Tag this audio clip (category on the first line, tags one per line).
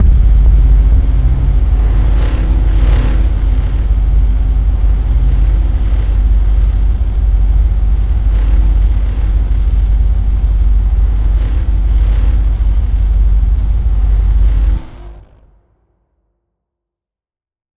Instrument samples > Synths / Electronic
ambient
atmosphere
atmospheric
design
designed
distort
drone
effect
layer
layered
pad
soundscape
space
string